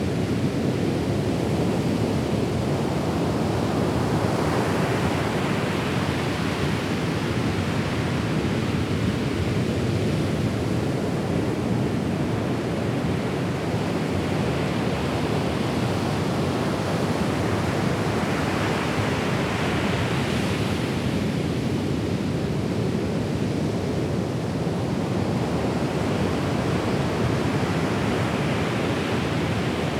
Soundscapes > Nature
Ambiance Ocean Ribeira Grande Loop Stereo 01
Ocean - Close/Medium Recording - Loop Recorded at Ribeira Grande, São Miguel. Gear: Sony PCM D100.
ambience, azores, coastal, environmental, fieldrecording, foam, loop, natural, nature, ocean, portugal, relaxation, saomiguel, sea, seaside, shore, soundscape, stereo, surf, water, waves